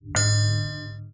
Sound effects > Electronic / Design
Gem Drop Treasure - Rare item drop in games
bell, drop, game, gear, gem, item, ring